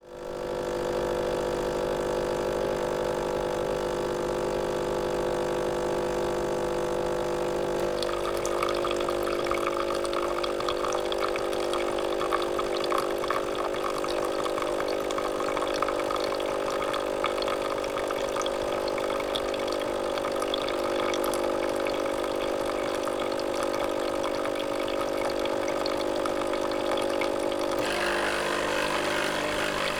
Sound effects > Objects / House appliances
MACHAppl Nespresso Descaling Rinse Cycle
Rinse cycle of a Nespresso Coffee makers descaling process. Machine has a water and steam vent that are getting rinsed. Processed in Reaper to reduce the length of each cycle.
pump, pouring, liquid, rinse, nespresso, water, motor, drip, pour, descale, machine, coffee